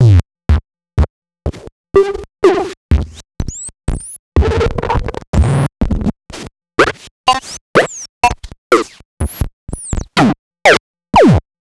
Sound effects > Experimental
NNC sherm-blips 01
Electronic blips n yips from Sherman filterbank
hits, drum, experimental, kits, idm